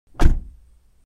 Sound effects > Vehicles
Car door closing (external)